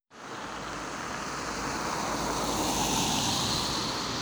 Sound effects > Vehicles
tampere car27
automobile, car, vechicle